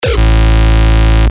Instrument samples > Percussion
Frechcore kick Testing 1-A 195bpm
Kick, Distorted, hardcore, Frechore, Hardstyle